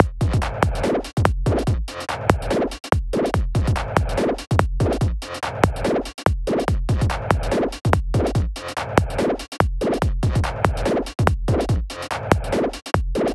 Music > Multiple instruments
147 - Into the wild Drums
a beat i have created in Bitwig studio.
147bpm, intothewild, drums